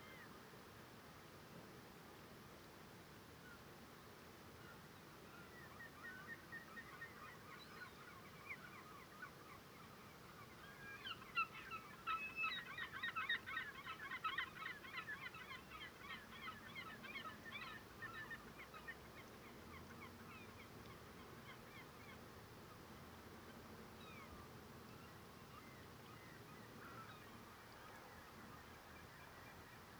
Soundscapes > Nature
Birds on the coast 5
Tascam DR-60 LOM Uši Pro (pair)
bird, birds, coast, field-recording, nature